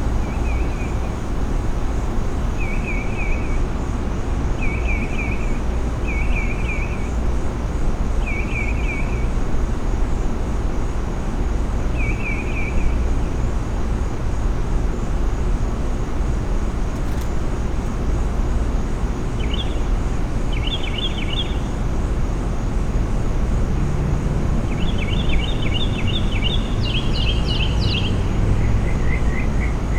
Soundscapes > Urban
AMBSubn-Summer Predawn on coastal island residential street, AC Hum, crickets, birds, distant traffic, 445AM QCF Gulf Shores Alabama Zoom F3 with Rode M5
Early Morning on residential street, coastal Alabama island, AC Hum, crickets, birds, distant passing traffic, 4:45AM
birds, birdsong, crickets, field-recording, morning, neighborhood, predawn, residential, summer